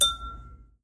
Sound effects > Objects / House appliances
FOODGware-Samsung Galaxy Smartphone, CU Glass Ding 02 Nicholas Judy TDC
ding, foley, glass, Phone-recording